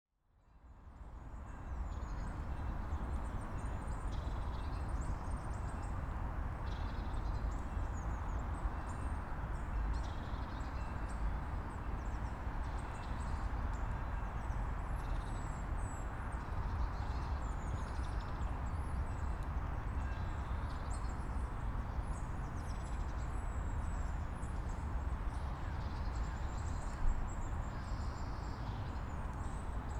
Soundscapes > Other
A morning recording from Hopwas Woods, Staffordshire. Zoom F3. Stereo. EM272Z1 Mics.
ambience, birds, field-recording, forest, nature, traffic, woodland, woods